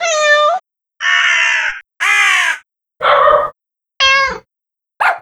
Sound effects > Animals
Dogs Cats Crows
A collection of cat, dog and crow sounds, 2 each, starting at full second intervals. In theory a useful sound resource. Practically daft, considering how much more practical it is to have each sound as a separate upload. Sounds used originally are from the uploads: